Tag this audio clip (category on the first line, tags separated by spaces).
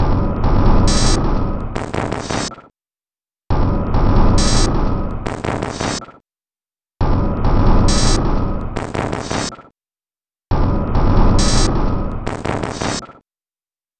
Instrument samples > Percussion
Alien Samples Packs Weird Loop Soundtrack Loopable Industrial Drum Dark Ambient Underground